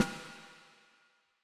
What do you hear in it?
Music > Solo percussion
Snare Processed - Oneshot 25 - 14 by 6.5 inch Brass Ludwig
rim, crack, perc, kit, flam, sfx, realdrum, snareroll, rimshots, oneshot, fx, roll, beat, ludwig, brass, snares, drum, drumkit, realdrums, reverb, snare, drums, rimshot, processed, snaredrum, hits, hit, percussion, acoustic